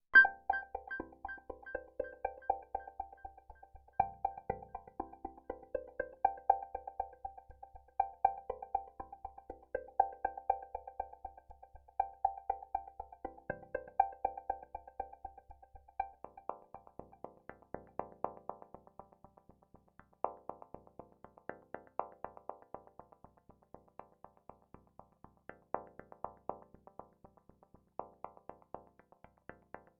Music > Solo instrument
Granular Texture out of a Guitar Sample - Several Sizes at 120bpm
A guitar sample is used to create a granular texture It's at 120bpm, loopable when you cut it in pieces It's brilliant, unexpected and clean Done with Torso S4 + sample from my own guitar
ambient, grains, granular, guitar, loop, torso, torso-s4